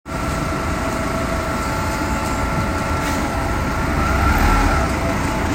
Soundscapes > Urban
Where: Hervanta keskus What: Sound of a bus door closing Where: At a bus stop in the evening in a calm weather Method: Iphone 15 pro max voice recorder Purpose: Binary classification of sounds in an audio clip